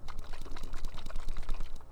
Sound effects > Objects / House appliances
TOYMisc-Blue Snowball Microphone Magic 8 Ball, Shake 05 Nicholas Judy TDC
Shaking a magic 8-ball.
Blue-brand, Blue-Snowball, foley, magic-8-ball, shake